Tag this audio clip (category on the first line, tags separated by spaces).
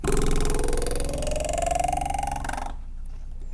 Sound effects > Objects / House appliances
metallic Trippy ting Clang ding Vibrate Wobble FX Metal Perc Beam Foley Vibration Klang SFX